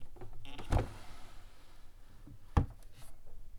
Sound effects > Objects / House appliances
Wooden Drawer 08
open, wooden, drawer